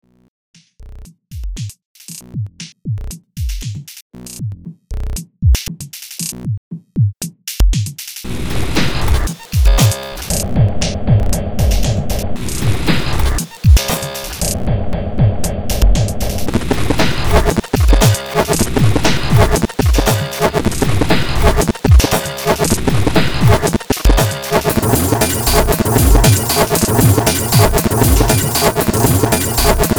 Music > Multiple instruments
Demo Track #3122 (Industraumatic)
Ambient, Horror, Industrial, Noise, Soundtrack